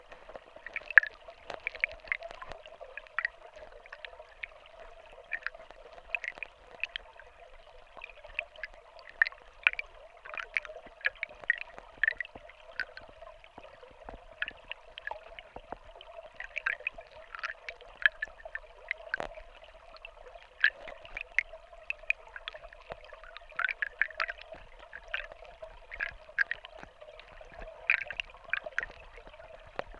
Soundscapes > Other
Subject : Hydrophone recording of Ruisseau de Caussels. A little down a riffle. Date YMD : 2025 October 06 Location : Albi 81000 Tarn Occitanie France. WIth a DIY piezoelectric hydrophone. Weather : Nice sunny day. Low to no wind. Processing : Trimmed and normalised in Audacity. Notes : Thanks to Felix Blume for his help and instructions to build the microphone, and Centre D'art le Lait for organising the workshop to build the DIY hydrophone. Note Rivers are low. Tarn probs has 1m less and Ruisseau Caussels some 30cm less.